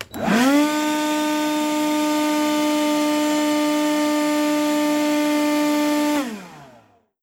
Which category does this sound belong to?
Sound effects > Other mechanisms, engines, machines